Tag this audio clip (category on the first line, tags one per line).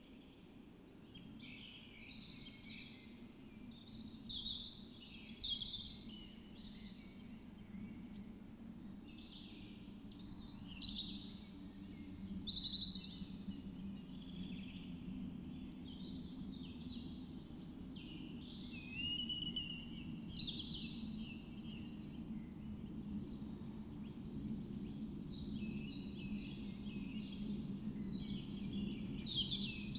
Soundscapes > Nature
soundscape
artistic-intervention
Dendrophone
nature
modified-soundscape
sound-installation